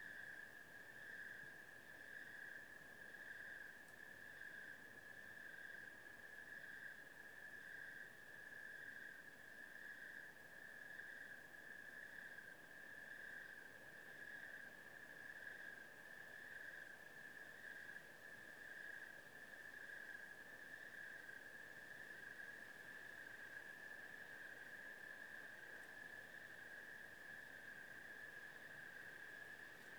Soundscapes > Nature
NightAmbiance RockyMountains
Field recording of a backyard on a summer night in the Rocky Mountains with sounds of insects and intermittent wind.
leaves, nature, wind, night, field-recording, summer, insects